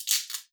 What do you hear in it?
Instrument samples > Percussion
Cellotape Percussion One Shot8
glitch, oneshot